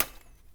Sound effects > Other mechanisms, engines, machines
metal shop foley -186
sfx, fx, knock, boom, foley, thud, bop, pop, percussion, crackle, bam, shop, rustle, little, bang, tools, metal, wood, sound, tink, perc, oneshot, strike